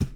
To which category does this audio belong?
Sound effects > Objects / House appliances